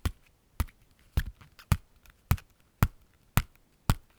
Sound effects > Objects / House appliances
Subject : Hitting a banana with another banana. Date YMD : 2025 04 20 Location : Gergueil France. Hardware : Zoom H2n mid mic. Weather : Processing : Trimmed and Normalized in Audacity.